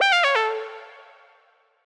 Sound effects > Electronic / Design
Stage Failed
A short sound effect of failing a stage.